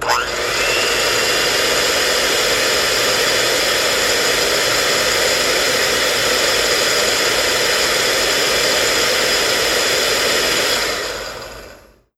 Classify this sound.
Sound effects > Objects / House appliances